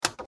Sound effects > Other mechanisms, engines, machines
Macro & Meso: This is a single, distinct key press from a vintage typewriter. The sound is a sharp, dry "tick" with no discernible background noise. Micro: The audio captures the raw, pure acoustic signature of the typewriter's key action. The sound is unadorned by echo or subtle ambient tones, focusing entirely on the percussive, metallic sound of the key striking the paper platen. Technical & Method: This sound was recorded approximately 2 years ago using an iPhone 14 smartphone in a quiet office room. The audio was processed using Audacity to remove any ambient noise, ensuring a clean and isolated sound. Source & Purpose: The typewriter is a real, classic Brazilian model, similar to a well-known brand such as the Olivetti Lettera 22. The purpose of this recording was to capture and preserve the unique, distinct sound of an iconic mechanical device for use in sound design, Foley, and other creative projects.